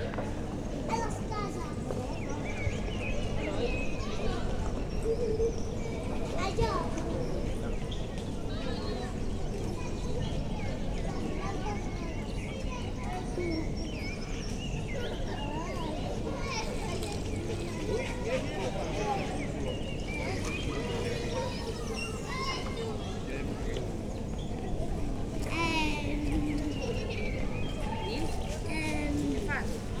Soundscapes > Nature
Kids playing in the park, many birds
kids playing in a park, there are many birds around
birds, kids, park, playground